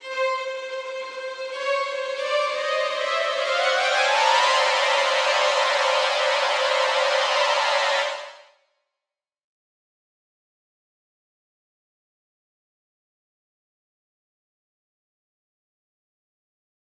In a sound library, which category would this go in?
Music > Multiple instruments